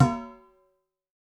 Sound effects > Objects / House appliances
Round baking tray new 1
A single hit of a shiny, new metal baking tray with a drum stick. Recorded on a Shure SM57.